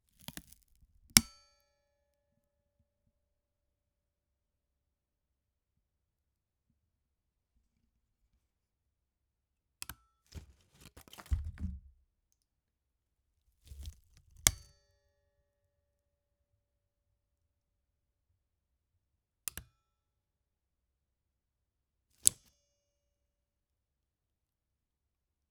Sound effects > Objects / House appliances
Knipex 41 04 180 locking pliers (Cardboard bite and release 2x)
Subject : Recording a Knipex 41 04 180 locking pliers / vice grip. Here, it's biting on some cardboard and then releasing, doing it twice. On the second time, I release it with it's lever mechanism. Date YMD : 2025 July 07 Location : Indoors. Sennheiser MKE600 P48, no filter. Weather : Processing : Trimmed and maybe sliced in Audacity.
indoor, cling